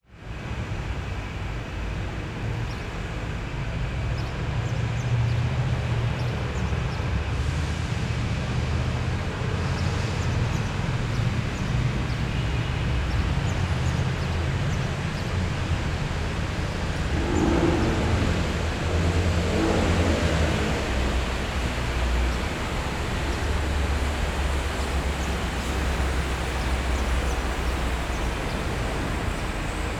Soundscapes > Urban
Busy Traffic With Low Planes Passing By And Subtle Bird Sounds
Recorded with Zoom H6 XY-Microphone. Location: Athens / Greece; on a balcony in Akadimias Steet in March 2025.